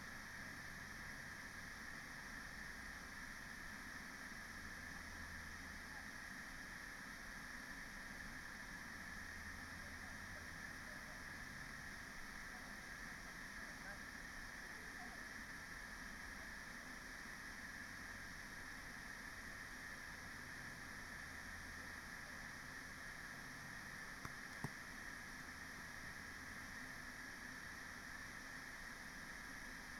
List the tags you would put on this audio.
Soundscapes > Nature
artistic-intervention
field-recording
natural-soundscape
raspberry-pi
sound-installation
soundscape
weather-data

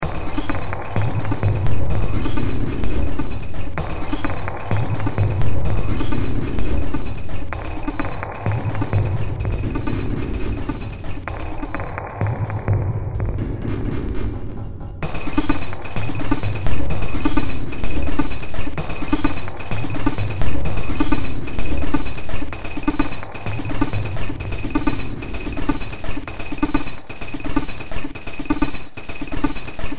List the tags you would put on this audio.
Music > Multiple instruments
Cyberpunk
Games
Industrial
Noise
Soundtrack

